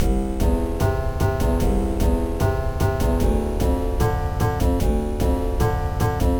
Music > Multiple instruments
Guitar loop made in furnace tracker. Great for video games and as a intermission